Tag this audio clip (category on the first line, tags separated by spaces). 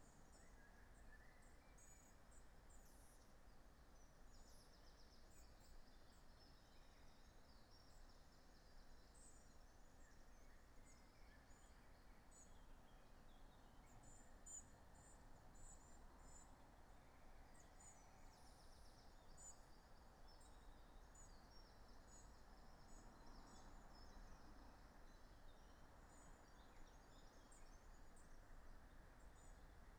Soundscapes > Nature
artistic-intervention data-to-sound Dendrophone natural-soundscape raspberry-pi sound-installation